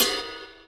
Instrument samples > Percussion
bellride weak 2 long
Agean, Amedia, bassbell, bell, bellcup, bellride, Bosphorus, click-crash, crashcup, Crescent, cup, cupride, cymbal, cymbell, Diril, Hammerax, Istanbul, Istanbul-Agop, Mehmet, Meinl, metal-cup, Paiste, ping, ride, ridebell, Sabian, Soultone, Stagg, Zildjian